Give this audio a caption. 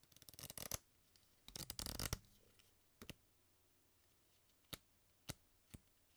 Sound effects > Other
ruffling cards
paper, ruffling, cards